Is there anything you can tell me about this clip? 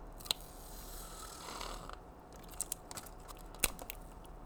Sound effects > Objects / House appliances
A scotch tape dispenser pulling tape down and rip.